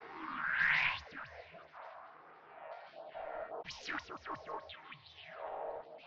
Soundscapes > Synthetic / Artificial
LFO Birdsong 11
Lfo, massive